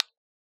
Sound effects > Objects / House appliances
Gamingcontroller Button 1 Click
Pushing a buttons on a Nintendo Switch gaming controller, recorded with an AKG C414 XLII microphone.
button, console, gaming-controller